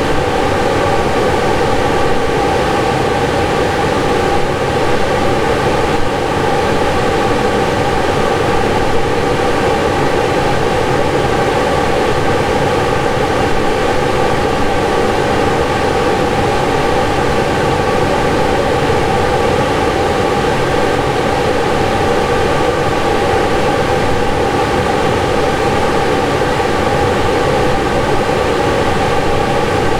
Sound effects > Objects / House appliances
HEPA Filter 01
The Zoom H4N multitrack recorder was placed on a surface level with the HEPA filter. Which was then turned on and allowed to operate for roughly 2 minutes. Afterwards I normalized the audio in Audacity.
zoom-h4n, hepa-filter, loud, movement, air-cleaner, blowing, noise